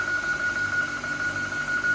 Sound effects > Vehicles
Spanish train close doors alarm loop
Looped alarm of the Spanish train Civia, when it closes doors, in order to be able to use it as much time as required. Alarma del Civia cuando cierra las puertas, puesta en bucle para poder usarla el tiempo necesario,
fuengirola, train, spain, station, cercanias, rail-road